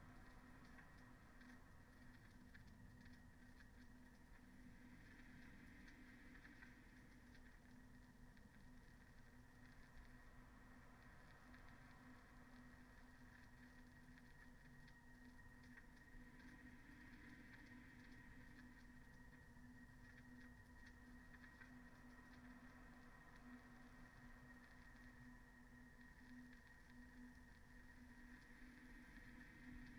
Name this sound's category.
Soundscapes > Nature